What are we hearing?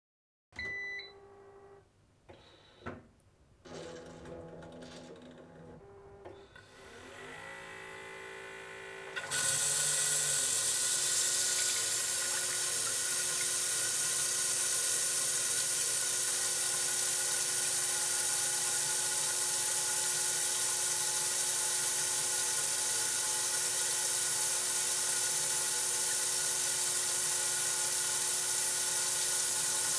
Sound effects > Objects / House appliances
Japanese-style toilet seat cleansing
This is the cleansing function recorded from a Japanese-style toilet seat (bidet).
bidet, field-recording, japanese, spraying, toilet